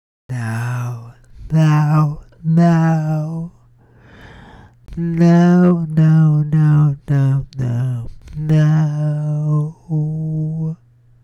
Music > Other
Sung or spoken with slight melody , spoken between untrained melodic voice, amateur and jazz vocal inspired Recorded on PC, with Thomson T-bone mic